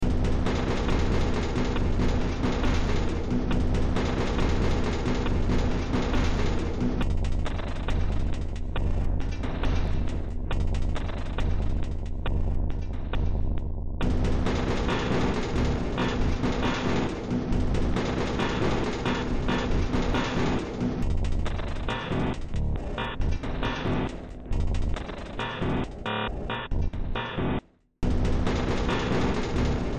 Music > Multiple instruments
Short Track #3566 (Industraumatic)
Track taken from the Industraumatic Project.
Noise, Underground